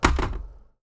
Sound effects > Objects / House appliances
COMTelph-Blue Snowball Microphone Nick Talk Blaster-Telephone, Receiver, Hang Up 03 Nicholas Judy TDC
A telephone receiver being hung up.